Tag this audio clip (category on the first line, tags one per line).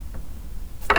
Sound effects > Other mechanisms, engines, machines
sound
thud
knock
sfx
bop
foley
strike
little
crackle
oneshot
wood